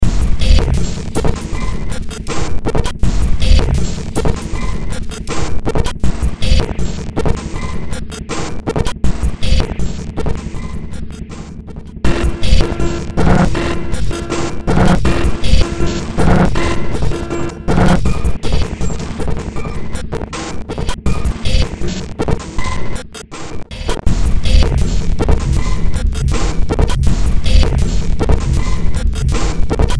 Music > Multiple instruments
Short Track #3332 (Industraumatic)
Ambient, Cyberpunk, Games, Horror, Industrial, Noise, Sci-fi, Soundtrack, Underground